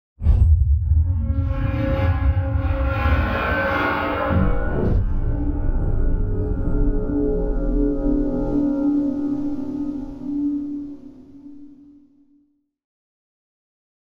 Sound effects > Experimental
SFX IMPACT DARK HORROR STEELBOAT 1
Sound made from heavily process recording of large metal sheets. Can be use as an impact with a tails. Initially recorder with ZOOM H5.
Metal, Cinematic, crunch, Impact, Meat-hit, Hit, Boom